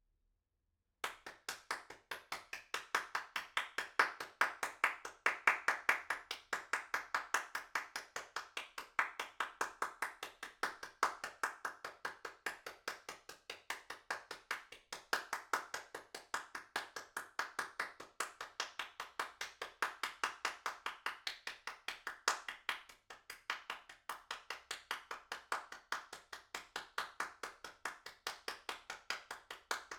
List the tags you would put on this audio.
Sound effects > Human sounds and actions
Applaud Applauding Applause AV2 clap clapping FR-AV2 individual indoor NT5 person Rode solo Solo-crowd Tascam XY

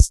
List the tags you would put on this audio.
Instrument samples > Percussion
Drums BassDrum Synth Electro DrumMachine Hi-Hats Retro Electronic DrumLoop Rare

